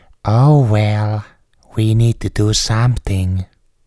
Speech > Solo speech
oh well we need to do something
calm, human, male, man, voice